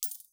Sound effects > Objects / House appliances
Coin Sound Effect
Sound effect of coins in a pile. Created by forcefully dropping coins against a hand in front of a microphone. Soft clattering against other coins. Recorded using Quadcast S USB Gaming Microphone. Post-processed for noise reduction.
Coin, Rustle